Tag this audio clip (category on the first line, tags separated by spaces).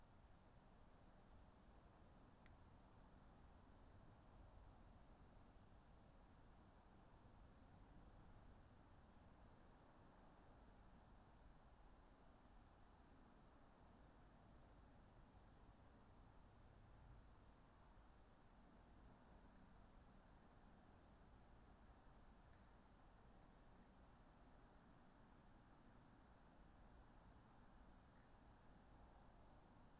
Soundscapes > Nature
alice-holt-forest artistic-intervention data-to-sound Dendrophone field-recording modified-soundscape natural-soundscape nature phenological-recording raspberry-pi sound-installation soundscape weather-data